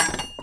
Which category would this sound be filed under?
Sound effects > Other mechanisms, engines, machines